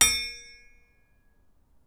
Sound effects > Other mechanisms, engines, machines
metal shop foley -089
pop, sound, sfx, oneshot, boom, percussion, metal, perc, little, shop, tools, bang, rustle, tink, thud, strike, crackle, foley, bop, bam, wood, knock, fx